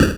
Instrument samples > Percussion
8 bit-Noise Percussion10
game, FX, percussion, 8-bit